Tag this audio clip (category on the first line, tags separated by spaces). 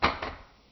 Sound effects > Other
collide hit impact plastic